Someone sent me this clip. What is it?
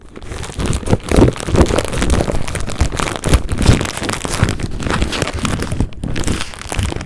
Sound effects > Objects / House appliances
Plastic scratch with my hands
Scratching some plastic with my hands Recorded with Tascam Portacapture X6
scratching, plastic, scratch